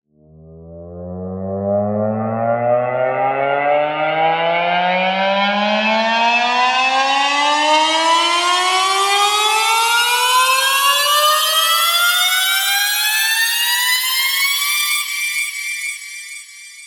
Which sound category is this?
Sound effects > Electronic / Design